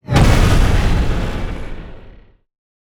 Sound effects > Other
Sound Design Elements Impact SFX PS 045
audio, blunt, collision, design, explosion, force, game, hard, heavy, percussive, rumble, sfx, sharp, shockwave, sound, strike